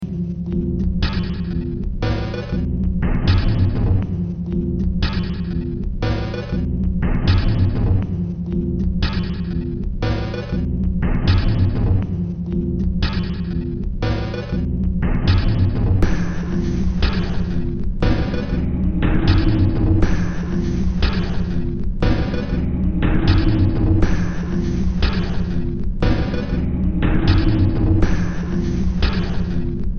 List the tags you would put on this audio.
Music > Multiple instruments
Games
Ambient
Noise
Horror
Soundtrack
Cyberpunk
Underground
Industrial
Sci-fi

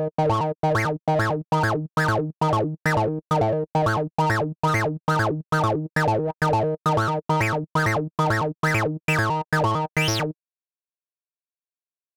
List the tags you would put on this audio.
Music > Solo instrument
303
electronic
hardware
Recording
techno

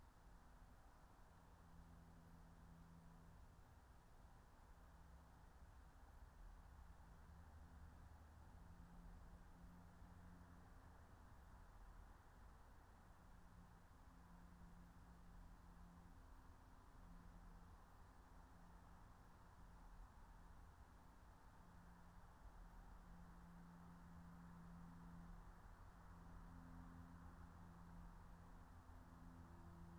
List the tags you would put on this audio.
Soundscapes > Nature
raspberry-pi
field-recording
natural-soundscape
alice-holt-forest
soundscape
phenological-recording
meadow
nature

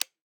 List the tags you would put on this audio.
Sound effects > Human sounds and actions
activation
off
click
switch
toggle
button
interface

